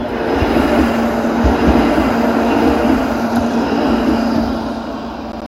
Soundscapes > Urban
ratikka14 copy
traffic, tram, vehicle